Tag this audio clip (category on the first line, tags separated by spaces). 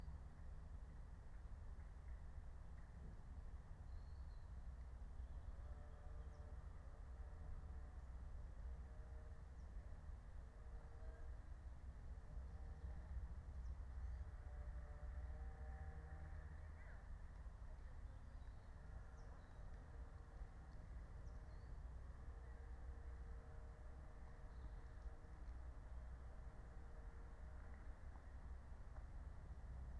Soundscapes > Nature
natural-soundscape raspberry-pi nature phenological-recording soundscape meadow alice-holt-forest field-recording